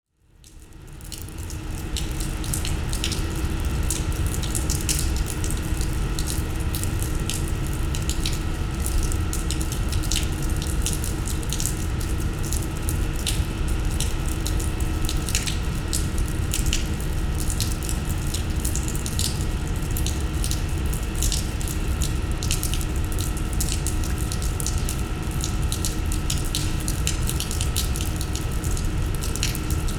Soundscapes > Urban
Roof Dripping in a City Alley
Recording of roofs dripping in a city alley with machine background. Equipment: Pair Clippy Omni Mics Zoom F3 Recorder
Roof, Dripping, Rain, sounddesign, City, Gutter, Urban, FieldRecording, Ambient, Water, Soundscape, Environment, Alley